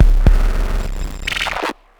Music > Solo percussion
Industrial Estate 24
soundtrack, Ableton, industrial, chaos, loop, techno, 120bpm